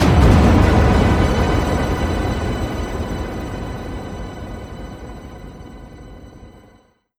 Sound effects > Electronic / Design
Creation Magic Blast
A positive restorative magic blast. Created by layering multiple piano notes and drums at the same time in FL Studio and passing the output through Quadrant VST. This was made in a batch of 14, many of which were cleaned up (click removal, fading, levelling, normalization) where necessary in RX and Audacity:
arcane, blast, bright, burst, celestial, construction, creation, divine, effect, enchantment, energy, explosion, fantasy, game, healing, health, light, magic, magical, positive, radiant, regeneration, regenerative, restoration, rpg, sacred, spawn, spawning, spell, support